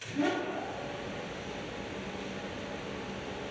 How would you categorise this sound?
Sound effects > Other mechanisms, engines, machines